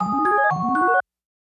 Music > Other
Bright and cheery, with a ghostly vibe to it. Produced on a Korg Wavestate, mastered at -3dBu in Pro Tools.